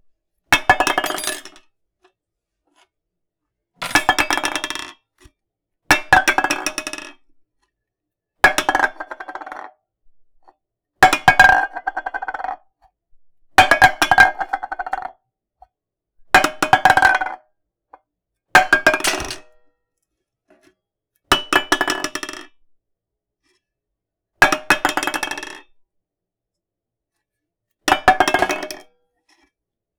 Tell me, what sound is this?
Sound effects > Objects / House appliances
tin can empty
Picking up and dropping an empty tin can on a countertop multiple times.